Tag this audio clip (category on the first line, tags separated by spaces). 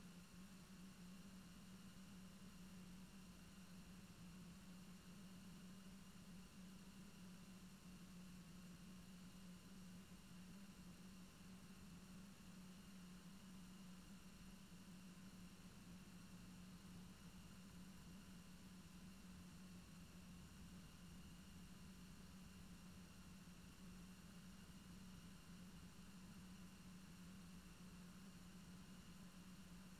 Soundscapes > Nature
meadow nature natural-soundscape phenological-recording raspberry-pi field-recording soundscape alice-holt-forest